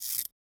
Sound effects > Objects / House appliances
Ribbon Tie 1 Texture
Tying a satin ribbon , recorded with a AKG C414 XLII microphone.
ribbon, tie, satin-ribbon